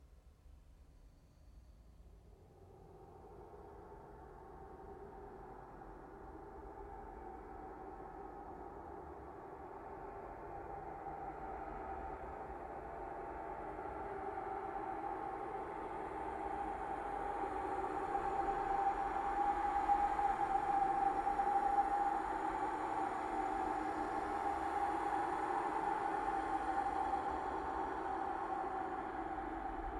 Sound effects > Other
Wind effect
Created in Audacity by blowing very gently into mic with a little distortion and sliding stretch.